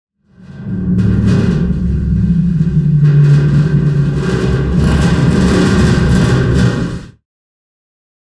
Sound effects > Other
Raw Industrial Recordings-Scratching Metal 010
abstract, cinematic, clang, distorted, drone, effects, experimental, foley, found, grungy, harsh, impact, industrial, mechanical, metal, metallic, noise, raw, rust, rusted, scraping, scratching, sfx, sound, sounds, textures